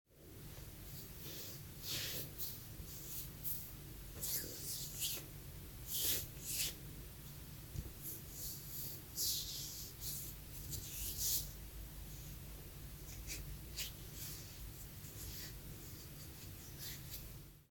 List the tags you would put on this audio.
Sound effects > Human sounds and actions
brushing
flesh
hands
rub
skin

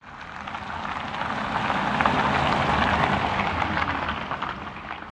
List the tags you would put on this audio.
Sound effects > Vehicles
ev
electric
driving
vehicle

